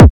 Instrument samples > Percussion
BrazilFunk Kick 20
Retouched WhoDat Kick from flstudio original sample pack. Just tweaked the Boost amount from flstudio sampler. Then just did some pitching work and tweaked the pogo amount randomly. Processed with ZL EQ, OTT, Waveshaper.
Distorted, Kick, BrazilianFunk, BrazilFunk